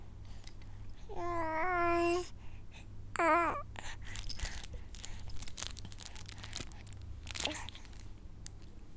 Speech > Other
Baby coo
baby, coo, infant